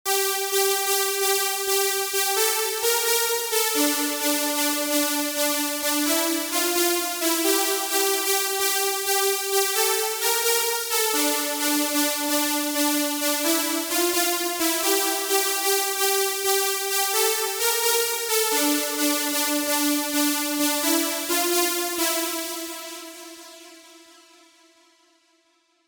Instrument samples > Synths / Electronic
Ableton Live. VST....Spire ...Melody 130 bpm Great melody,Dmin